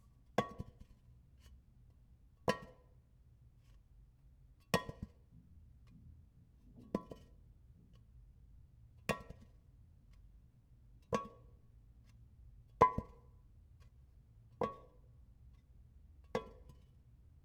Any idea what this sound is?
Sound effects > Objects / House appliances
item drop empty small metal can on toilet carpet
Small, empty chewing gum metal box, dropped from a short distance on a toilet carpet. Recorded with Zoom H2.